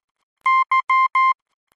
Sound effects > Electronic / Design
A series of beeps that denote the letter Y in Morse code. Created using computerized beeps, a short and long one, in Adobe Audition for the purposes of free use.